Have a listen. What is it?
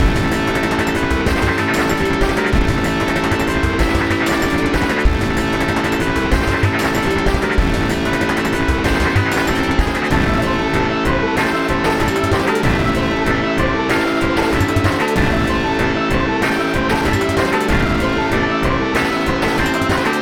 Music > Multiple instruments
Intense loop
Another another loop made with beepbox. This one is much more intense and fast-paced, although it is a bit messy. Use this for an action or chase sequence in a video game or something.
action, beepbox, chase, loop